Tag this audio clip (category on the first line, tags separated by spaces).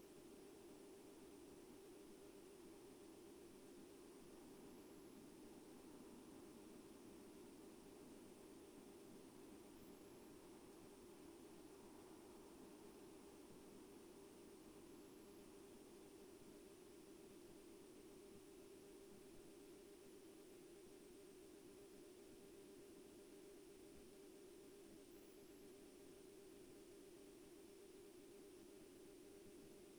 Soundscapes > Nature
artistic-intervention weather-data phenological-recording field-recording alice-holt-forest sound-installation data-to-sound natural-soundscape modified-soundscape raspberry-pi soundscape Dendrophone nature